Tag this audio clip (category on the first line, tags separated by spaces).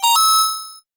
Sound effects > Electronic / Design
coin designed game-audio high-pitched pick-up tonal